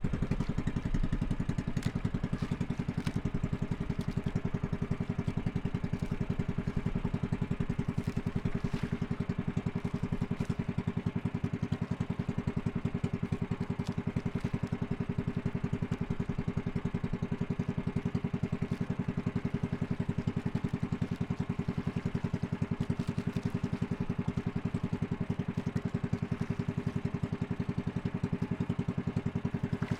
Sound effects > Vehicles
250814 174855 PH Bangka
Bangka (take 2). In the Philippines, Bangka is a small outrigger boat used by people for fishing Here, I’m travelling in one of these small boats with a young cute girl (7 years old) who’s sitting in front of me and laughing some times when the waves splash on the boat, while her father is driving on the back. Please note that I pointed the recorder to the right outrigger of the bangka, so that one can hear the water on it, while the little girl on the front faces to the left microphone, and her father and the motor of the boat face to the right mic. At the end of the recording (#3:58), as we’re approaching the shore, the man turns off the engine and says in Tagalog language : ‘’There are big waves over there.’’. Recorded in August 2025 with a Zoom H5studio (built-in XY microphones). Fade in/out applied in Audacity.